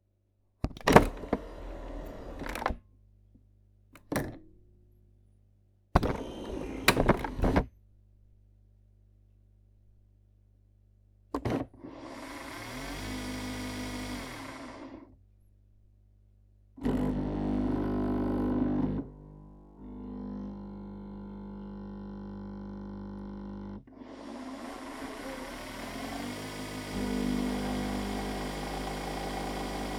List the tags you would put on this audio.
Sound effects > Objects / House appliances
coffee sfx machine nespresso kitchen pour operation

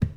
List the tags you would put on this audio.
Sound effects > Objects / House appliances
liquid tool knock bucket container pour tip household hollow fill object cleaning slam plastic scoop handle debris carry kitchen water shake pail lid metal clatter clang spill foley drop garden

